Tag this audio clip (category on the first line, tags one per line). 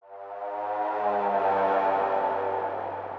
Sound effects > Electronic / Design
abstract
psytrance
psy
psyhedelic
sfx
effect
efx
fx
sci-fi
electric
sound-design
sounddesign
sound
soundeffect